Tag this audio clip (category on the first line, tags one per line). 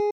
Instrument samples > String
arpeggio
cheap
design
guitar
sound
stratocaster
tone